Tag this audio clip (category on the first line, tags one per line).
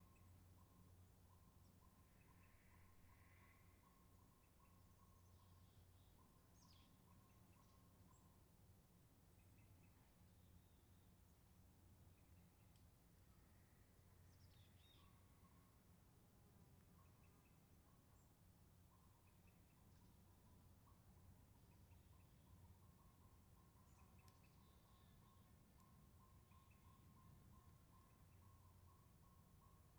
Soundscapes > Nature
silent
field-recording
birds
calm
forest